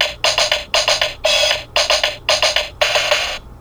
Sound effects > Objects / House appliances
TOYElec-Blue Snowball Microphone, CU Drum Rhythm, Looped 01 Nicholas Judy TDC
An electronic drum rhythm loop.
Blue-brand, Blue-Snowball, drum, electronic, loop, rhythm, toy